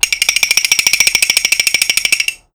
Sound effects > Objects / House appliances
TOONImpt-Blue Snowball Microphone, CU Woodpecker, Hits Nicholas Judy TDC
cartoon, Blue-brand, hit, woodpecker, Blue-Snowball